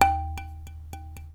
Music > Solo instrument
Marimba Loose Keys Notes Tones and Vibrations 9
block, foley, fx, keys, loose, marimba, notes, oneshotes, perc, percussion, rustle, thud, tink, wood, woodblock